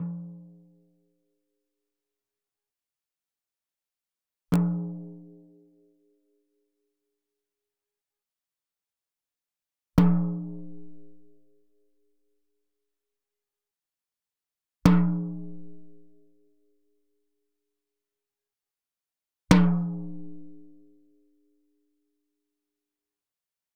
Music > Solo percussion
Hi Tom- Oneshots - 15- 10 inch by 8 inch Sonor Force 3007 Maple Rack
kit, rimshot, velocity, hitom, instrument, roll, percs, tom, toms, beatloop, hi-tom, fill, rim, studio, drum, beats, tomdrum, flam, drums, drumkit, perc, percussion, beat, oneshot, acoustic